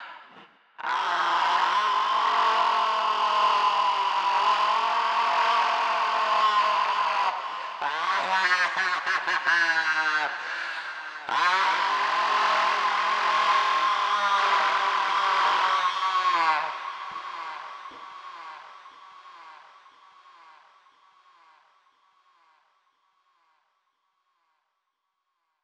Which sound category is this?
Speech > Other